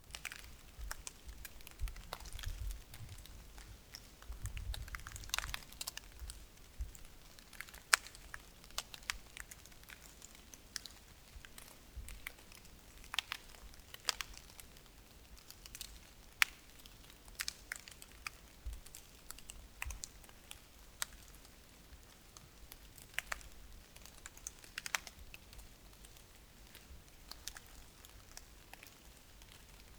Sound effects > Natural elements and explosions
dripping rain hitting leaves 1
Dripping rain in forest hitting leaves. Sounds like a fire. Location: Poland Time: November 2025 Recorder: Zoom H6 - SGH-6 Shotgun Mic Capsule